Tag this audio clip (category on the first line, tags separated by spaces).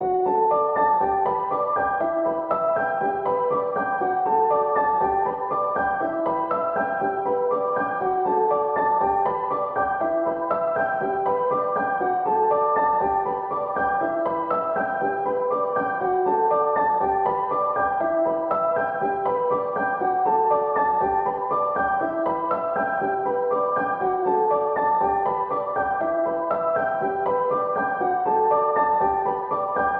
Music > Solo instrument
music 120 120bpm simple pianomusic piano free simplesamples reverb loop samples